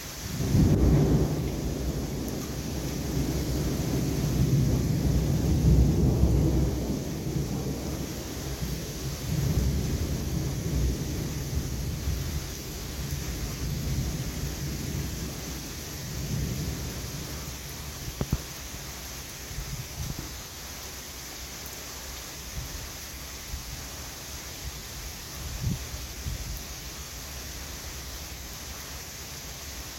Soundscapes > Nature
STORM-Samsung Galaxy Smartphone, MCU Thunder, Booms, Rumbles, Heavy Rain Nicholas Judy TDC
Thunderstorm with booming and rumble, distant heavy rain.
boom, distant, heavy, Phone-recording, rain, rumble, thunder, thunderstorm